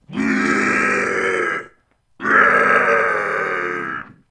Sound effects > Human sounds and actions
Loud Zombie
Decided to do some more zombie sounds! Just remember to give me a credit and all is good.
horror, monster, zombie, undead